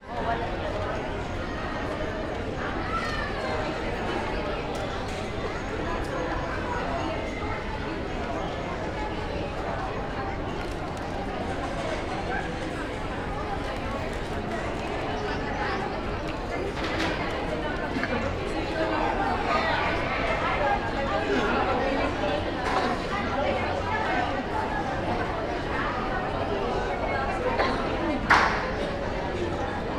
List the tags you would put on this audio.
Soundscapes > Indoors
adults airport ambience atmosphere boarding busy chatting children conversations departures field-recording flight gate gates general-noise hubbub kids lively Manila people Philippines soundscape talking travel travelling trip voices walla

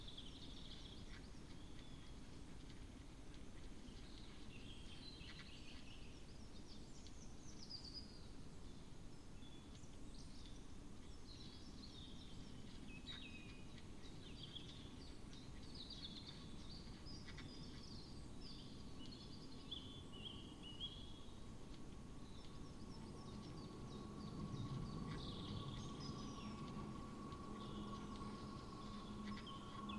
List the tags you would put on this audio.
Soundscapes > Nature
phenological-recording weather-data Dendrophone artistic-intervention sound-installation data-to-sound field-recording soundscape natural-soundscape modified-soundscape nature raspberry-pi

